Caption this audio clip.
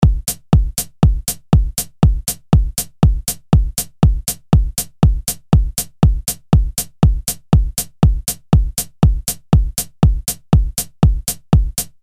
Music > Solo percussion
Ableton Live. VST......Fury-800......Drums 120 Bpm Free Music Slap House Dance EDM Loop Electro Clap Drums Kick Drum Snare Bass Dance Club Psytrance Drumroll Trance Sample .
Kick, Clap, House, 120, Dance, Bpm, Slap, EDM, Loop, Bass, Electro, Drum, Free, Music, Snare